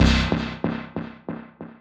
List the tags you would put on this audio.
Instrument samples > Synths / Electronic
bassdrop
synthbass
wavetable

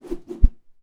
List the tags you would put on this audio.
Sound effects > Natural elements and explosions
dissapear
FR-AV2
NT5
pouf
Rode
Tascam
whoosh

